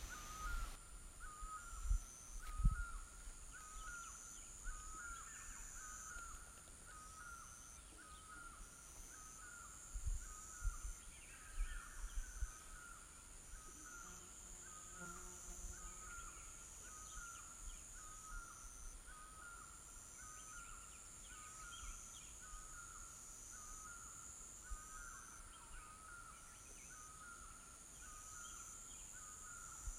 Soundscapes > Nature
Morning Ambience, Pang Ung, Thailand (March 13, 2019)
Morning recording at Pang Ung, Thailand, on March 13, 2019. Includes birds, insects and calm natural sounds near the lake.